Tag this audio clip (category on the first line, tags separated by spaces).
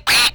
Sound effects > Animals
duck quack toy